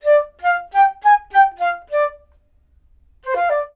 Instrument samples > Wind
recorded with a zoom box mic.
woodwind, instruments, flute